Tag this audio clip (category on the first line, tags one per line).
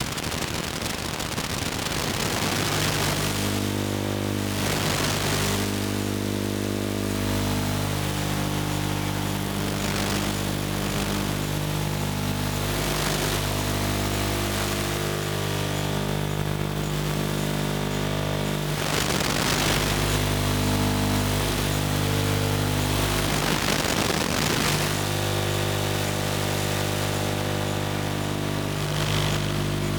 Sound effects > Experimental
Buzz
Electric
Ether
Glitch
Hum
Noise
Sci-Fi
Soma
Sound-Design
Static
Weird